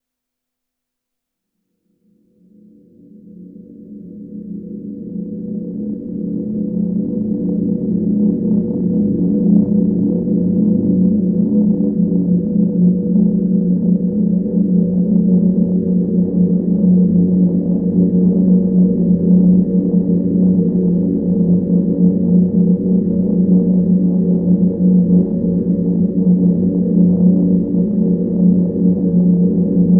Sound effects > Electronic / Design
Hopeful glass drone
"Creepy musical glass" from xkeril, pitch-shifted, reversed and looped using the EHX 22500 dual loop pedal, with additional pitch shifting from EHX Pitchfork and sampled using Chase Bliss Onward. Resulting into a rather hopeful long drone pad.
ambient, artificial, drone, experimental, glass, soundscape